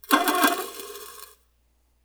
Sound effects > Objects / House appliances
aluminum can foley-003
alumminum, can, foley, fx, household, metal, scrape, sfx, tap